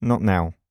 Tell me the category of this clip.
Speech > Solo speech